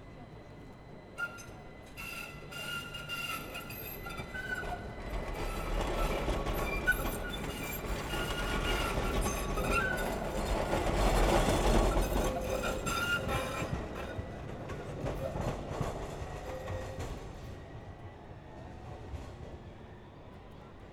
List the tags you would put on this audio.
Sound effects > Vehicles
field-recording
streetcar
street
tram
traffic
city

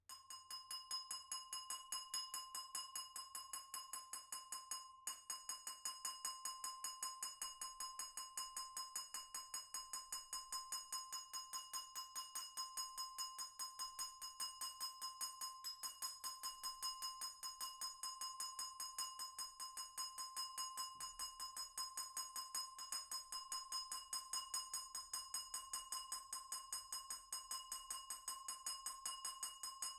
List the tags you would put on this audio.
Sound effects > Other
applause
cling
clinging
FR-AV2
glass
individual
indoor
NT5
person
Rode
single
solo-crowd
stemware
Tascam
wine-glass
XY

